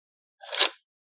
Sound effects > Objects / House appliances
They put the bread in the toaster
Recorded on a Samsung Galaxy Grand Prime
bread, toaster